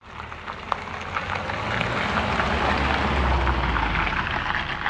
Sound effects > Vehicles
a combustionengine car driving by